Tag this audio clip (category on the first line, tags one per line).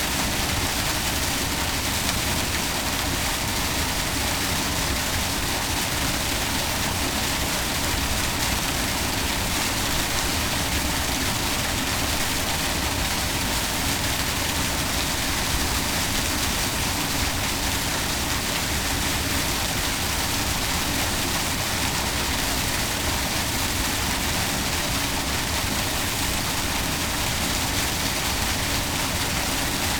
Sound effects > Natural elements and explosions
water
urbain
FR-AV2
France
Early
NT5
Early-morning
Tarn
Tascam
Mono
Albi
81000
Outdoor
Single-mic-mono
2025
Rode
City
handheld
waterfall
Saturday
WS8
Occitanie
hand-held
Wind-cover
morning